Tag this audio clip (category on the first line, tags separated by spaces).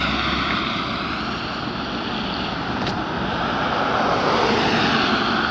Soundscapes > Urban
car engine